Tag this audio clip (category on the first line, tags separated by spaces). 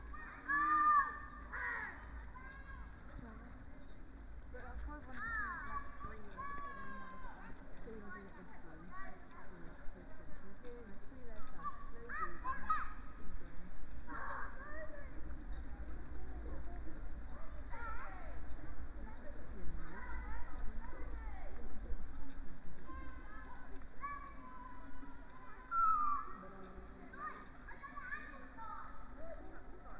Soundscapes > Nature
nature raspberry-pi